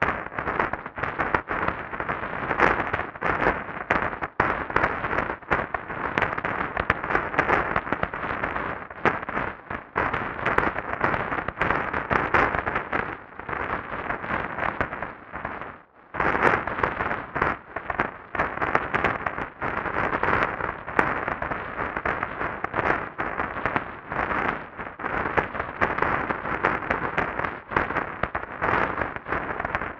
Sound effects > Objects / House appliances
Error TV 1 Noise
I synth it with phasephant! Used the Footsteep sound from bandLab PROTOVOLT--FOLEY PACK. And I put it in to Granular. I used Phase Distortion to make it Crunchy. Then I give ZL Equalizer to make it sounds better.
Radio, TV